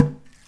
Sound effects > Other mechanisms, engines, machines
metal shop foley -209
boom, rustle, tools, shop, bop, foley, percussion, little, oneshot, perc, fx, knock, sfx, bang, wood, tink, sound, crackle, pop, strike